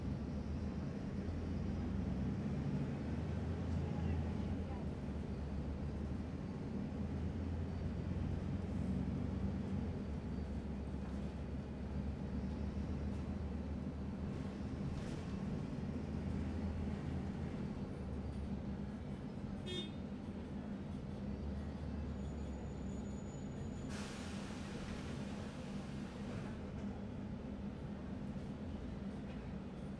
Soundscapes > Urban
Driving by on bus as passanger (ST)
Recorded with Zoom H6studio XY built-in microphones.